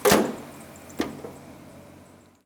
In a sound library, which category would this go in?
Sound effects > Other mechanisms, engines, machines